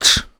Speech > Solo speech
Annoyed - Tshh
U67, dialogue, Video-game, Mid-20s, annoyed, Single-take, Man, NPC, Tascam, voice, Voice-acting, singletake, Male, grumpy, Human, oneshot, Vocal, FR-AV2, Neumann, talk, upset